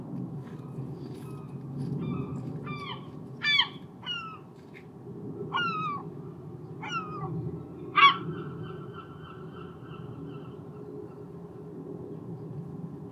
Sound effects > Animals
Some seagulls fly overhead squawking. Recorded on a back-garden in a small town in the UK. Afternoon of saturday 12-Apr-2025. Sunny, temperature ~18C, slight breeze. Noise reduction applied in Audacity to remove airplane and distant traffic noise.
field-recording, bird, Dare2025-05